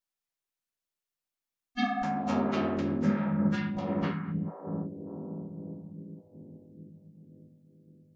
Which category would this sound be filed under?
Sound effects > Electronic / Design